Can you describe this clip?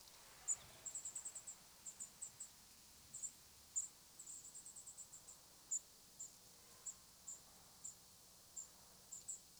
Soundscapes > Nature
Chipping sparrow / Bruant familier Tascam DR-60 RodeNTG3